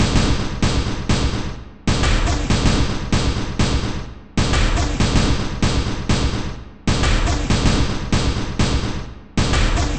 Instrument samples > Percussion
This 192bpm Drum Loop is good for composing Industrial/Electronic/Ambient songs or using as soundtrack to a sci-fi/suspense/horror indie game or short film.
Weird, Industrial, Ambient, Soundtrack, Dark, Underground, Loop, Samples, Loopable, Alien, Packs, Drum